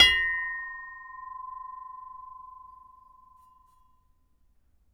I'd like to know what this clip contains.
Sound effects > Other mechanisms, engines, machines
metal shop foley -039
bang, boom, bam, pop, little, sound, thud, bop, shop, wood, percussion, tools, rustle, perc, crackle, tink, sfx, oneshot, strike, metal, fx, foley, knock